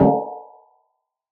Sound effects > Electronic / Design
CRASH ANALOG GLOSSY HIT
CHIPPY, DING, ELECTRONIC, HARSH, INNOVATIVE, OBSCURE, SYNTHETIC, UNIQUE